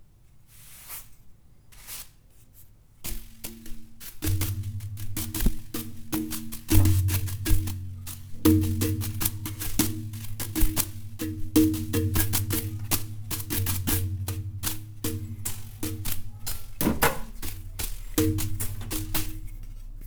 Sound effects > Objects / House appliances
paint brush drum beat foley-001
bristle, delicate, sfx, soft, surface, wiping